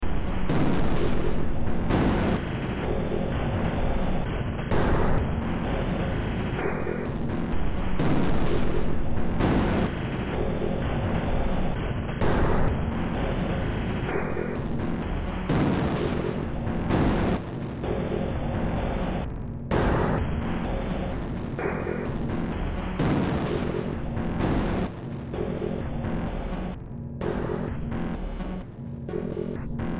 Music > Multiple instruments
Demo Track #3384 (Industraumatic)
Noise, Cyberpunk, Horror, Ambient, Industrial, Sci-fi, Soundtrack, Games, Underground